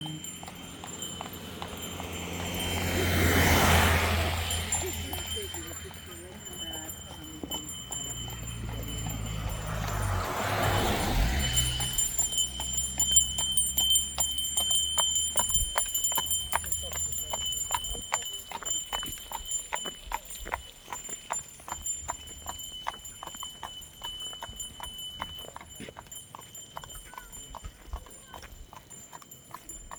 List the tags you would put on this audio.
Soundscapes > Nature
CARRIAGE FIELD FIELD-RECORDING HORSE MOUNTAINS POLAND RECORDING STREET TATRA URBAN

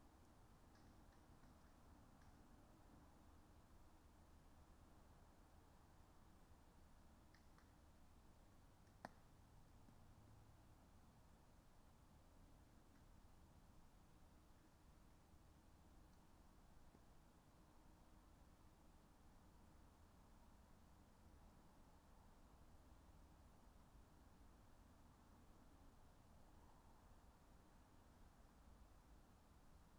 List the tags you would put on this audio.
Soundscapes > Nature
alice-holt-forest soundscape artistic-intervention weather-data phenological-recording natural-soundscape Dendrophone modified-soundscape nature raspberry-pi field-recording data-to-sound sound-installation

